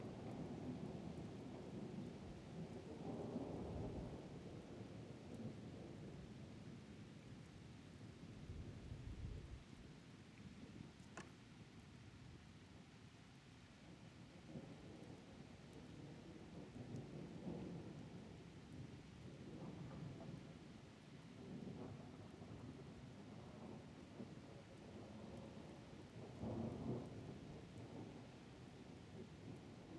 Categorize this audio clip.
Sound effects > Natural elements and explosions